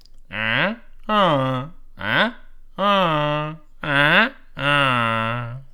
Sound effects > Human sounds and actions
not excited sounds
male, thinking, depressed, human